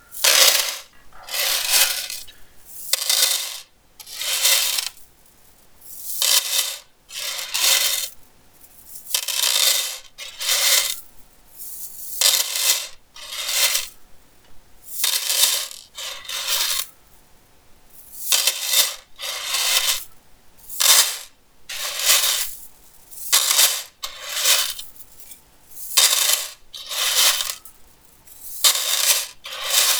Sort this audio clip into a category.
Sound effects > Objects / House appliances